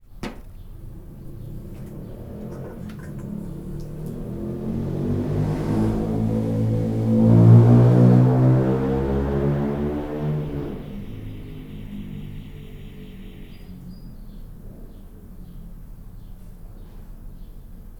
Soundscapes > Urban
Car passing by and setting distant alarm on
Noisy car passing kind of fast by my quite suburban yard and setting a distant alarm on. Recorded with Tascam DR-05X portable mini recorder.